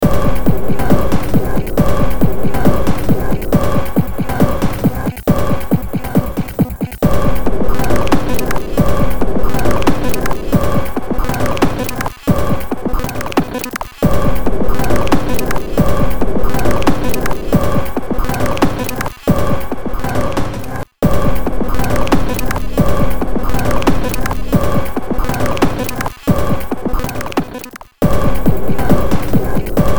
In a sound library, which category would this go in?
Music > Multiple instruments